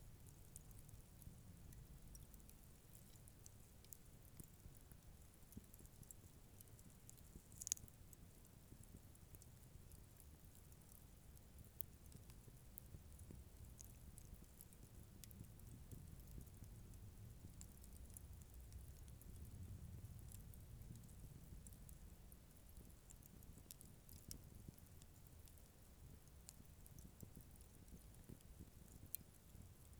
Soundscapes > Nature
Campfire RockyMountains 03
Field recording of a dying campfire's embers with wind, plane, and vehicle noises in the background.
burning, crackle, fire